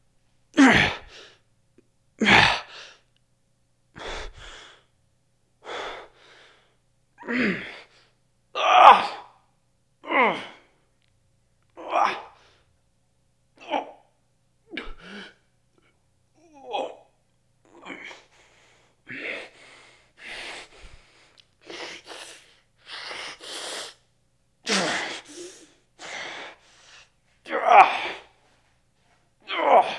Sound effects > Human sounds and actions
Henchman #2 Fight Vocalizations
combat, enemy, fighting, gasp, goon, Henchman, punch, thug